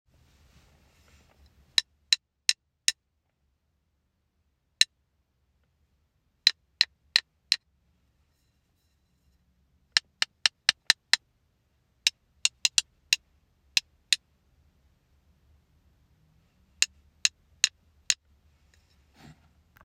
Sound effects > Objects / House appliances
had some drumsticks in the car and messed around with them a bit. recorded on my iPhone in my carmy